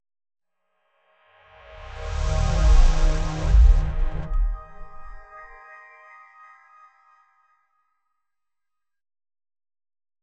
Sound effects > Other
A portal opens depositing a visitor from the future. Or, whatever you're going to use it for, that's good too.